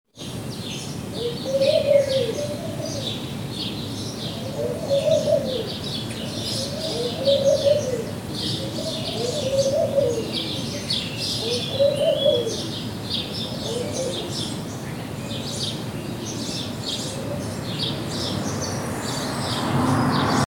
Sound effects > Animals
Sparrows doves 01 2022.04
Strong, noisy ambience. Sparrows and doves from the neighborhood. Morning recording if i recall.
ambience, birds, dove, sparrow